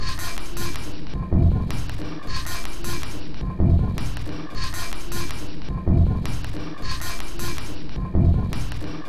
Instrument samples > Percussion
This 211bpm Drum Loop is good for composing Industrial/Electronic/Ambient songs or using as soundtrack to a sci-fi/suspense/horror indie game or short film.
Underground, Samples